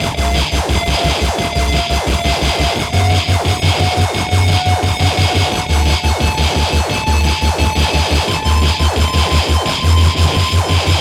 Music > Multiple instruments
blaster gun beat
Simple 4/4 beat with blaster gun effect and bitcrushed drums. Made in Studio One.